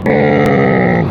Sound effects > Animals
A young dromedary at the All-American Petting Zoo at the Sweet Onion Festival in Vidalia, GA, United States.